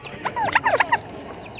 Sound effects > Animals
Fowl - Turkey; Tom Clucking, Close Perspective
A tom turkey clucks. Recorded with an LG Stylus 2022
barnyard, cluck, farm, gobble, poultry, Thanksgiving, turkey